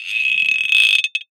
Sound effects > Objects / House appliances
Masonjar Screw 4 Texture
Running along the rim of a glass mason jar with a metal screw, recorded with an AKG C414 XLII microphone.